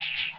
Soundscapes > Synthetic / Artificial
LFO Birdsong 12
birds, Lfo, massive